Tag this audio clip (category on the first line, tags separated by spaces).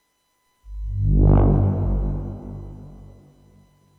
Instrument samples > Other
synth woosh